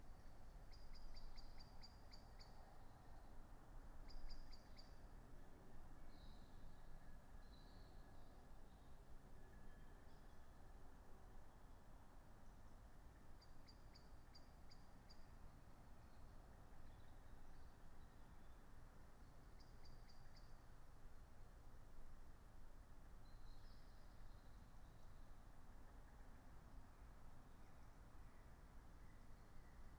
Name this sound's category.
Soundscapes > Nature